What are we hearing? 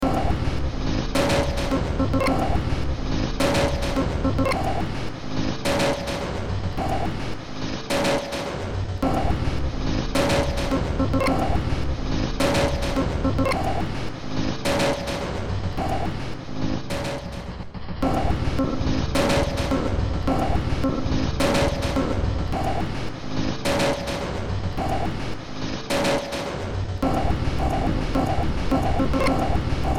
Music > Multiple instruments
Short Track #3705 (Industraumatic)
Ambient, Cyberpunk, Industrial, Sci-fi, Soundtrack